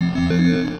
Sound effects > Electronic / Design
Digital Interface SFX created using Phaseplant and Portal.